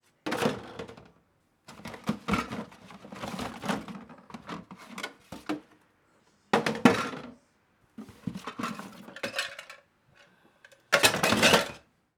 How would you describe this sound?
Sound effects > Human sounds and actions
OBJHsehld Dropping stuff into the trash bin
Dropping a plastic milk bottle, carborad box and empty soup cans into a plastic trash bin.
trash, container, bin, metallic, cardboard, bottle, metal, rubbish, cans, sfx, plastic, garbage